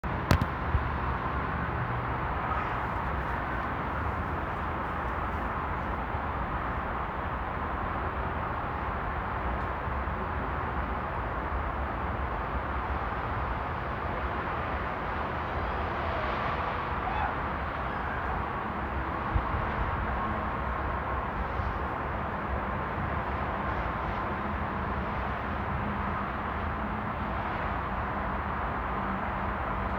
Soundscapes > Urban
Urban City Ambience (Highway, Plane overhead)
cars driving past a nearby highway, a plane flies overhead to a major airport.
cars, city, field-recording, highway, road, street, traffic